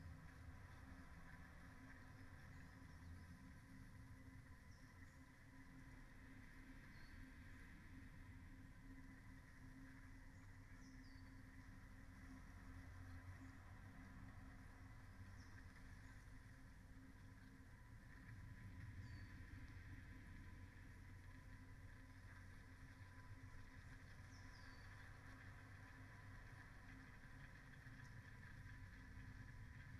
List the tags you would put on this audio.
Soundscapes > Nature
raspberry-pi artistic-intervention phenological-recording sound-installation nature weather-data alice-holt-forest soundscape data-to-sound natural-soundscape field-recording Dendrophone modified-soundscape

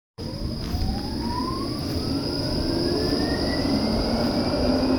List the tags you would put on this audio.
Soundscapes > Urban
recording Tampere tram

